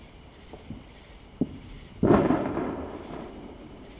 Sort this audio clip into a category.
Sound effects > Other